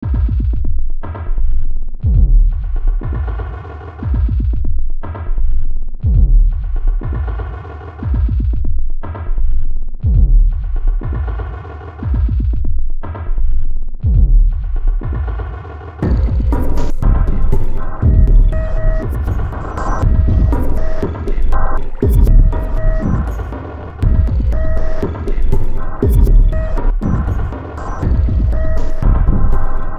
Music > Multiple instruments
Demo Track #2957 (Industraumatic)
Underground, Cyberpunk, Ambient, Noise, Industrial, Sci-fi, Games, Soundtrack, Horror